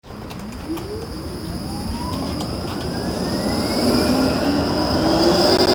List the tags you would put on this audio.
Soundscapes > Urban
streetcar
tram
transport

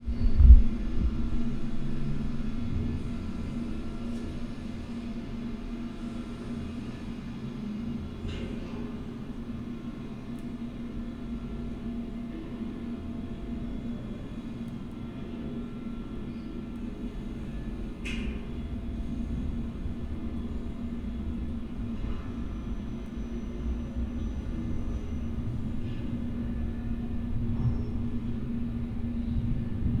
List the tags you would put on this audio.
Soundscapes > Urban
constuction
contact
build

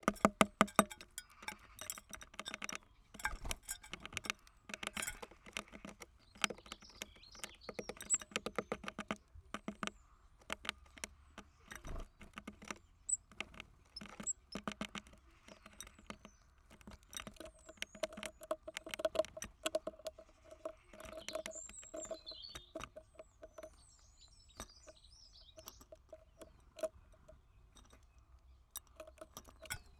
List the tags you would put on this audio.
Soundscapes > Nature
birds
feeder

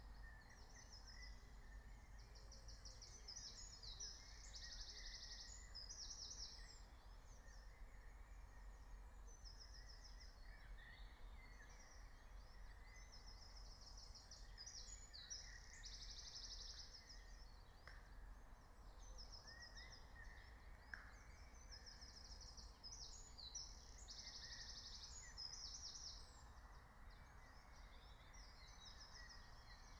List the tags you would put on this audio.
Soundscapes > Nature
meadow
field-recording
alice-holt-forest
soundscape
natural-soundscape
phenological-recording
nature
raspberry-pi

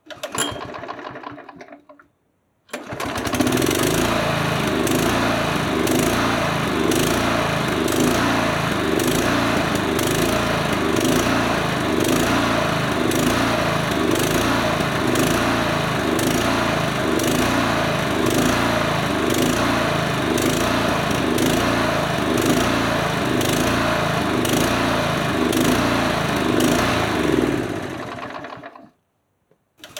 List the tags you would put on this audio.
Sound effects > Other mechanisms, engines, machines
motor
run
start
surge
mower
engine
lawnmower
sputter
shutoff

